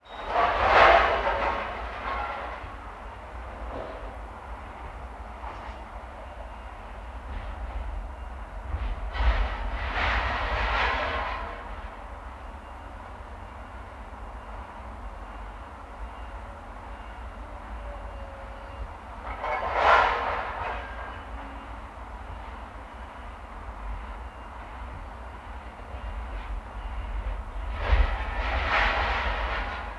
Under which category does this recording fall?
Soundscapes > Urban